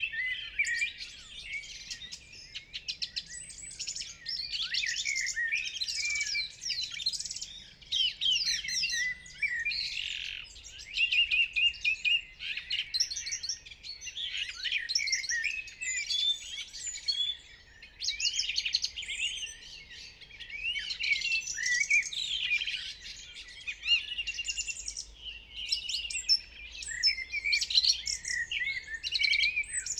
Soundscapes > Nature
Mid-May Dawn Chorus
Recorded on 18/05/2025 and representing the time span of 04:20-05:25, with sunrise being at 05:01 (all times BST). This is effectively a 38-minute summary of the time span referred to above. The recording is dominated by song thrush and Eurasian wren. Other birds that can be heard include: Common wood pigeon Eurasian blackbird Dunnock Carrion crow Eurasian blackcap The recorder (Zoom H1essential) and microphones (Earsight stereo pair) were left overnight in a bramble bush in a country park, with the microphones being approximately one metre apart.